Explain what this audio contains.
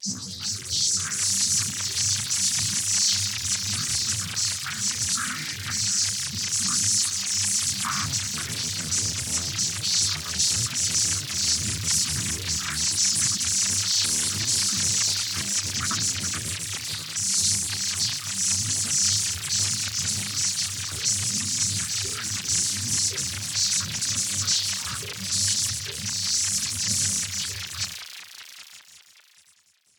Sound effects > Electronic / Design
RGS-Random Glitch Sound 4
I was playing Fracture plugin randomly because I was boring. Synthed with Phaseplant only. Processed with Vocodex and ZL EQ
glitch, texture